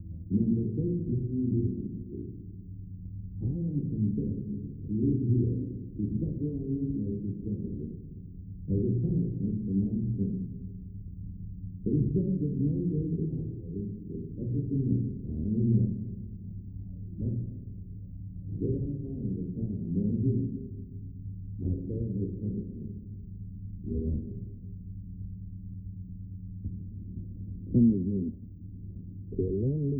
Sound effects > Objects / House appliances
Haven't uploaded in centuries, so here's another TV sound for your enjoyment and use for any purposes! This time it's a horror TV show. Perfect for as a stand-in for creature features, Twilight Zone-type programs or anything horror-related on TV. Or just another horror film on DVD or VHS for those teenage night parties of yours.